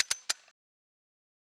Sound effects > Other mechanisms, engines, machines
Ratchet strap-10
Ratchet strap cranking
clicking, machine, ratchet, mechanical, crank, strap, machinery